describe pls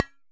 Sound effects > Objects / House appliances
Solid coffee thermos-007
percusive, recording, sampling